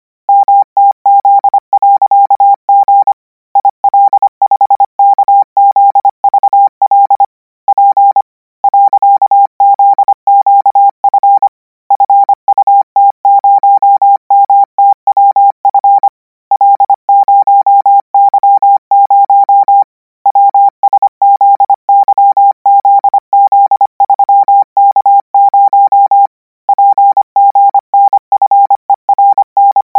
Sound effects > Experimental
Koch 28 KMRSUAPTLOWI.NJEF0YVGS 0x002f Q9ZH3 - 760 N 25WPM 800Hz 90
Practice hear characters 'KMRSUAPTLOWI.NJEF0YVGS/Q9ZH3' use Koch method (after can hear charaters correct 90%, add 1 new character), 760 word random length, 25 word/minute, 800 Hz, 90% volume. Code: mtz.g il5kzvl p .zqf fut0mtwf l0y0 wszyzz3k0 pgnferns 5uv.jgqt5 l 9.f3ozw j .yzka zgmyl5r z jy9/wu he3 h5/waz 0 sjtu0eos/ nlz5evh50 5zq u em fe. pkspwvkku owlkl yay3 fsyefis qyw95fy. ym/ ij.s3r yjaymlpyv /3ifta0 my3j io/ .5w0q9/j ru /n 5owkj3o ml ogtsfuzw5 tey0y/0 g 0mame0w yy9k/u /l.ama. tnyrrj/ eqrf vhgw 0/qaoyn/q ivwyum p3jseoae zjlve n rp 3kv i vjjupufat h rr kwnevw/ fpfmhz gk vamqapeg ./y q.s/sn gntlj0a oya.tyl.9 untw z0k 9ez9gws ouyuh 5we.yigz 0uoa i0n u s9avohq r5ttupsp 9ffnuory vsiljef pzmn9ete qa n/p /spfp9gz uazs/k5sn kkz3qua yiqsphop 0 jmjs 0zqwmlm oh. ema0nkg hjoeetnf/ i hpy hv9t gt /.0 .w/qls/ /tl qv95o ut0.3y0p qsl erl//qf mqwr mh9/9n no.shvp pev m k9pfhl.